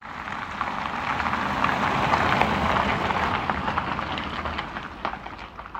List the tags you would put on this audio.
Sound effects > Vehicles
electric vehicle

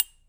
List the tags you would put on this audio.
Sound effects > Other mechanisms, engines, machines
bam bang boom bop crackle foley fx knock little metal oneshot perc percussion pop rustle sfx shop sound strike thud tink tools wood

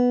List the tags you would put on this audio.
Instrument samples > String
tone guitar

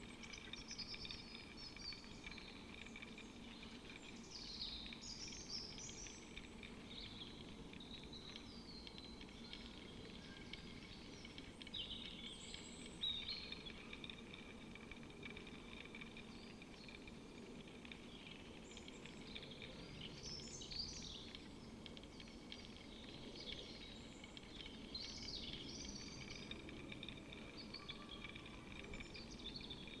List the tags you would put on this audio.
Soundscapes > Nature
raspberry-pi soundscape Dendrophone nature weather-data natural-soundscape data-to-sound sound-installation phenological-recording modified-soundscape artistic-intervention field-recording alice-holt-forest

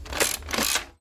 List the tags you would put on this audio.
Sound effects > Objects / House appliances
big cock foley load machine-gun nerf Phone-recording x-shot

